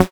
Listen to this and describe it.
Instrument samples > Synths / Electronic
CINEMABASS 8 Gb
additive-synthesis, bass, fm-synthesis